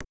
Sound effects > Electronic / Design
Generic interact sound Recorded with a Rode NT1 Microphone